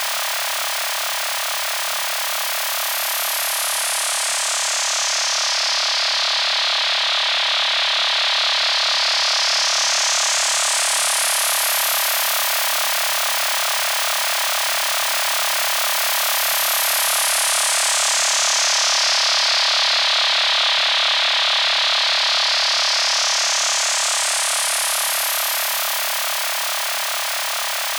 Soundscapes > Synthetic / Artificial
Space Drone 004
Drone sound 004 Developed using Digitakt 2 and FM synthesis